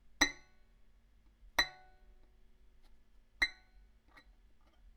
Instrument samples > String
Plucking broken violin string 7
Plucking the string(s) of a broken violin.